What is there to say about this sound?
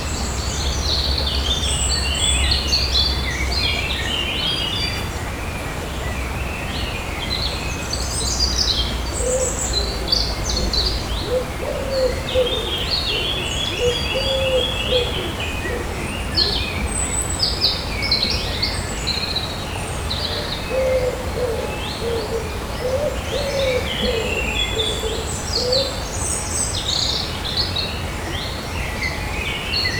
Soundscapes > Nature
2025 05 19 11h55 Albi - Lechappee vert - Pont Est
Subject : The bridge to the very east of "L"échappee vert" of albi. Facing East. Date : 2025 05/May 19 around 13h Location : Albi 81000 Tarn Occitanie France Weather : Rather clear sky nice weather. Hardware : Zoom H2n on a "gooseneck/clamp" combo for action cams. Processing : Trim and normalised.
81000, albi, ambience, Early-afternoon, field-recording, H2n, May, Occitanie, tarn, urbain-nature, urbain-park